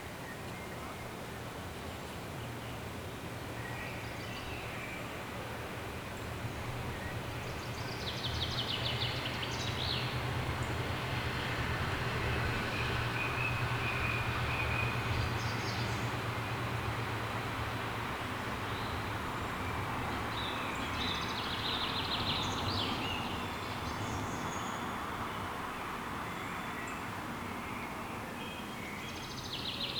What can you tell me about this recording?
Soundscapes > Nature
Kraków, Las Wolski, Rezerwat Panieńskie Skały
The sound of the birds in the forest and airplanes in the sky. Some human noises in the background, even cars on the road.